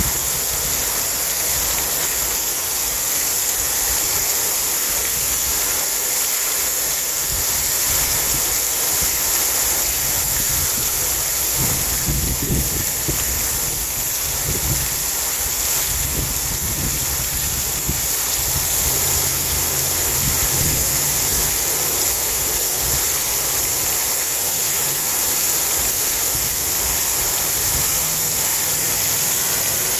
Sound effects > Natural elements and explosions
WATRFoun-Samsung Galaxy Smartphone, CU Huge, Sprays, Splashes, Distant Traffic Nicholas Judy TDC
Huge water fountain sprays and splashes with distant traffic.